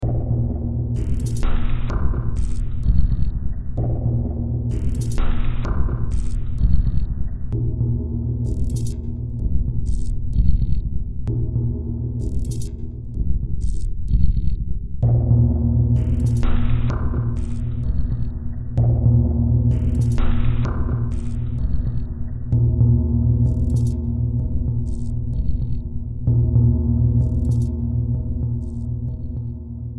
Music > Multiple instruments
Demo Track #3665 (Industraumatic)
Ambient, Sci-fi, Cyberpunk, Industrial, Horror, Noise, Soundtrack